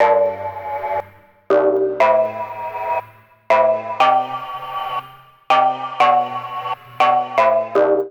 Music > Solo instrument
Good Bells Synth with a touch of mine!